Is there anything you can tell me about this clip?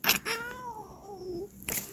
Sound effects > Animals
ANMLCat-Samsung Galaxy Smartphone, CU Yawn Nicholas Judy TDC
A cat yawning.
yawn, cat, Phone-recording